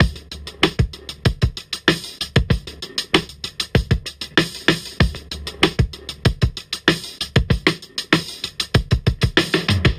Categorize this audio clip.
Music > Solo percussion